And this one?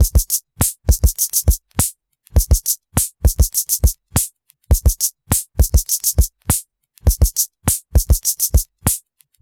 Instrument samples > Percussion
Loops and one-shots made using Welson Super-Matic Drum Machine